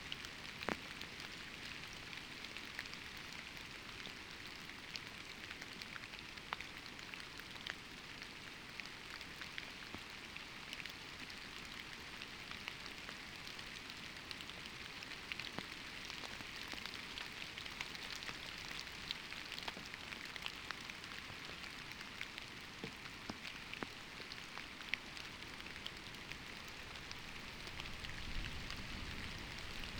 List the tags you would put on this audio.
Soundscapes > Nature
soundscape
alice-holt-forest
weather-data
field-recording
Dendrophone
modified-soundscape
phenological-recording
data-to-sound
natural-soundscape
sound-installation
nature
raspberry-pi
artistic-intervention

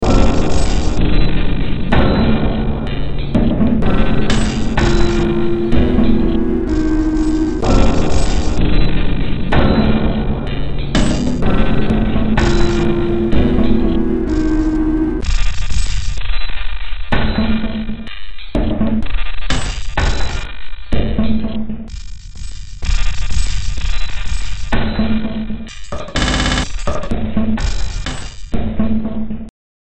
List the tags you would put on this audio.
Music > Multiple instruments
Ambient
Cyberpunk
Noise

